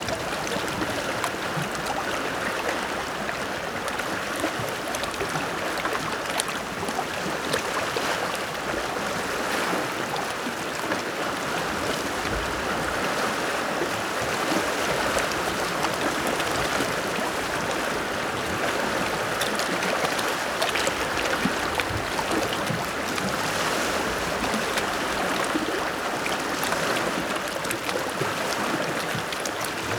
Soundscapes > Nature
Sea waves on the rocks
Sea waves crashing against rocks. Recorded above a rock at medium range from the waves. Recorded with a Zoom H1 essential.
water, rocks, sea, coast, field-recording, waves, beach, nature, ocean